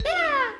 Sound effects > Human sounds and actions
Sound effect for unit death recorded on Rode-NT-1A
death, Rode-NT-1A, Unit